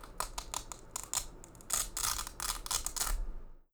Sound effects > Objects / House appliances
CLOTHRip-Blue Snowball Microphone, CU Baseball Mitt, Velcro, Slow Nicholas Judy TDC
A slow baseball mitt velcro rip.
foley, velcro